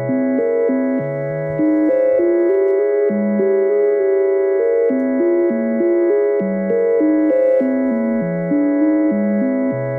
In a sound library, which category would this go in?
Soundscapes > Synthetic / Artificial